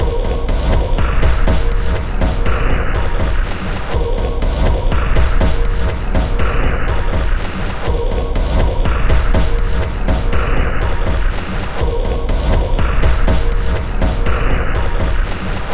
Instrument samples > Percussion
This 122bpm Drum Loop is good for composing Industrial/Electronic/Ambient songs or using as soundtrack to a sci-fi/suspense/horror indie game or short film.
Ambient, Packs, Samples, Industrial, Soundtrack, Dark, Weird, Loopable, Drum, Underground, Loop, Alien